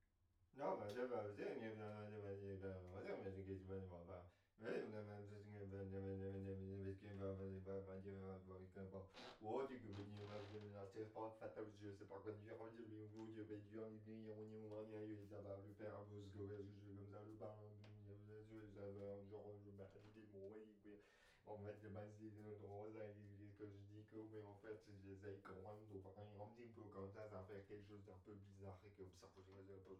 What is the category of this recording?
Speech > Other